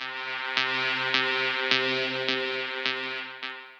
Instrument samples > Synths / Electronic
CVLT BASS 142
bass, bassdrop, clear, drops, lfo, low, lowend, stabs, sub, subbass, subs, subwoofer, synth, synthbass, wavetable, wobble